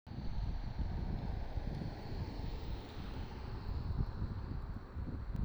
Sound effects > Vehicles
tampere car2
automobile, vechicle, car